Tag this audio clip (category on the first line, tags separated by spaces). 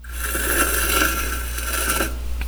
Sound effects > Objects / House appliances
metal water foley fx household can tap scrape